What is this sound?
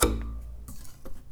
Sound effects > Other mechanisms, engines, machines
Woodshop Foley-037
bang, bam, rustle, tools, perc, oneshot, strike, thud, shop, wood, knock, percussion, metal, fx, boom, little, crackle, bop, foley, sound, sfx, tink, pop